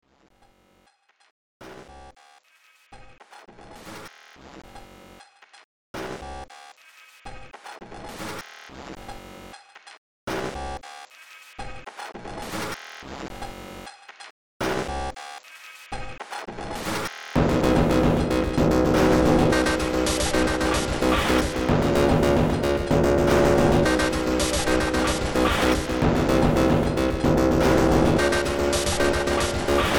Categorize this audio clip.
Music > Multiple instruments